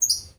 Sound effects > Animals
Irritated Bird Chirp
single, call, angry, calling, bird-chirping, bird, bird-chirp, isolated, indonesia, birdie, irritated, birb, chirp, short, little-bird